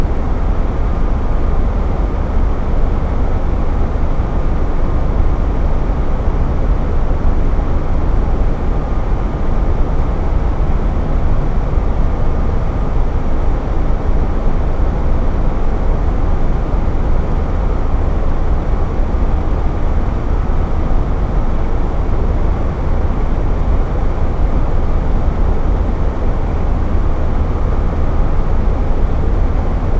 Sound effects > Objects / House appliances
Air Conditioner 02
I placed a Rode M5 microphone (connected to a Zoom H4N multitrack recorder) near an air conditioner in operation and pressed record. I normalized the collected audio using Audacity. Then uploaded the edited file for others to use.